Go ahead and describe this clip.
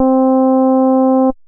Instrument samples > Synths / Electronic
03. FM-X ALL2 SKIRT3 C3root
Yamaha, FM-X, MODX, Montage